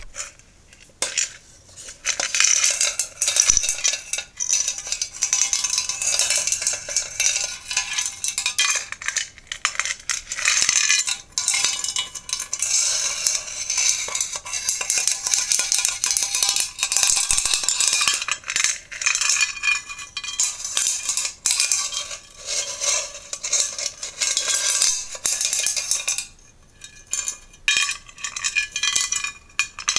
Sound effects > Objects / House appliances
Metallic rustling, tinny rattling
A field recording of random scraping, rattling and rustling with small metallic objects.
metallic, Rattling